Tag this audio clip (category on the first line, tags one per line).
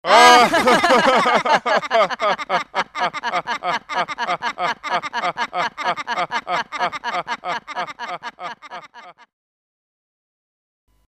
Sound effects > Human sounds and actions
carcajada
laughing
laughter
risa